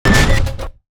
Sound effects > Other
Sound Design Elements Impact SFX PS 076
Effects recorded from the field.
audio, blunt, cinematic, collision, crash, design, effects, explosion, force, game, hard, heavy, hit, impact, percussive, power, rumble, sfx, sharp, shockwave, smash, sound, strike, thudbang, transient